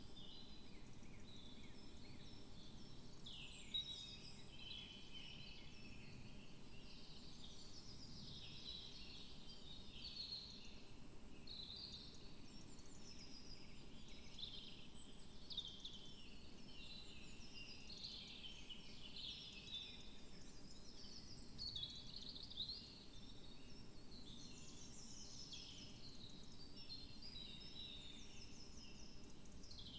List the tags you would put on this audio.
Soundscapes > Nature
nature sound-installation artistic-intervention weather-data alice-holt-forest raspberry-pi field-recording soundscape Dendrophone data-to-sound natural-soundscape phenological-recording modified-soundscape

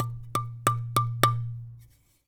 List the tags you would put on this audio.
Music > Solo instrument
thud foley marimba wood woodblock oneshotes percussion block rustle notes loose tink fx keys perc

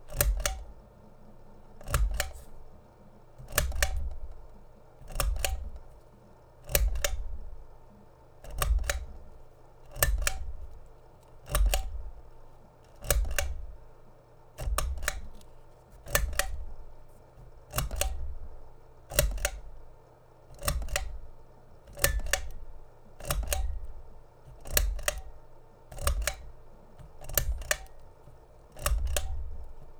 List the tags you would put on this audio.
Sound effects > Other mechanisms, engines, machines
foley lamp lamp-switch off pull-chain

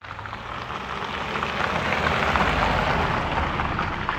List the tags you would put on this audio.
Sound effects > Vehicles
combustionengine driving car

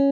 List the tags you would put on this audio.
Instrument samples > String
arpeggio cheap tone guitar

